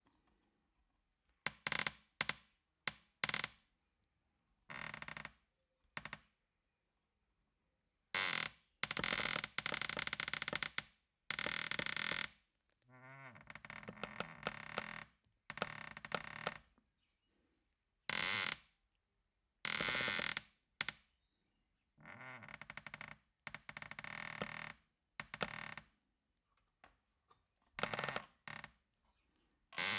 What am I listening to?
Sound effects > Objects / House appliances
Variations on a squeaky window, for the needs of a movie.